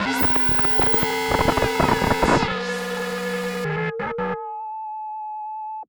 Sound effects > Experimental
Analog Bass, Sweeps, and FX-102
basses, bass, korg, pad, bassy, complex, machine, robot, analog, dark, effect, weird, sweep, oneshot, alien, analogue, retro, sfx, electro, snythesizer, scifi, sample, trippy, synth, sci-fi, electronic, mechanical, robotic, fx, vintage